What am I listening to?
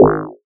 Instrument samples > Synths / Electronic
BWOW 2 Ab
fm-synthesis, bass, additive-synthesis